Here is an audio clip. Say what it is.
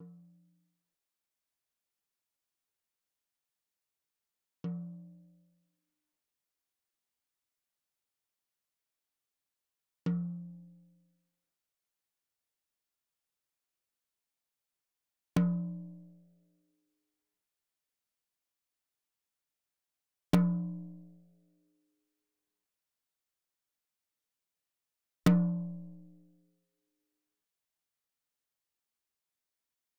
Music > Solo percussion
Hi Tom- Oneshots - 3- 10 inch by 8 inch Sonor Force 3007 Maple Rack
acoustic, beats, percs